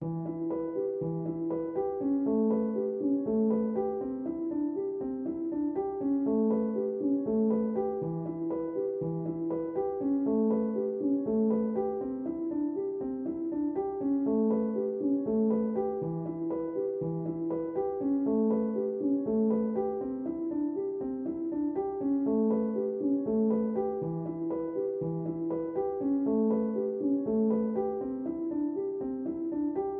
Music > Solo instrument
Piano loops 190 octave down short loop 120 bpm
120, 120bpm, free, loop, music, piano, pianomusic, reverb, samples, simple, simplesamples